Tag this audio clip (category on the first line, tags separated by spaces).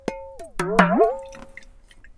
Sound effects > Objects / House appliances
drill percussion mechanical sfx stab hit foundobject object bonk foley metal fx natural perc oneshot fieldrecording industrial clunk glass